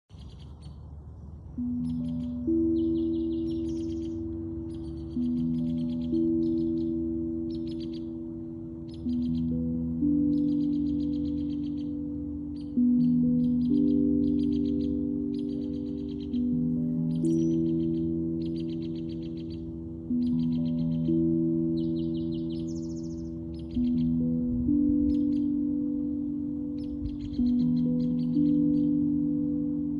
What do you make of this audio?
Music > Solo instrument
Losing Old Habits - Ambient Tongue Drum
Ambient, Dreamscape, Outdoor, Wave